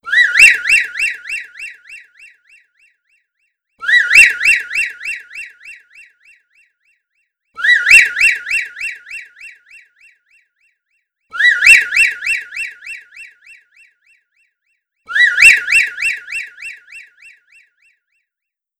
Sound effects > Electronic / Design
SCIRetro-CU Echoey Slide Whistle Nicholas Judy TDC
An echoey slide whistle. Retro outer space noise.
echo, outer-space, retro, sci-fi, slide-whistle